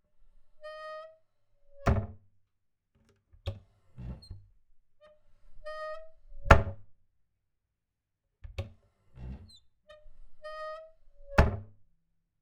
Sound effects > Objects / House appliances
Small plate cabinet door - top hinge recording
Subject : In door of a cabinet. Where we put our plates :) Date YMD : 2025 04 Location : Indoor Gergueil France Hardware : Tascam FR-AV2 and a Rode NT5 microphone. Weather : Processing : Trimmed and Normalized in Audacity. Maybe with a fade in and out? Should be in the metadata if there is.
2025, cabinet, cupboard, Dare2025-06A, Door, FR-AV2, hinge, indoor, kitchen, NT5, Rode, Tascam, XY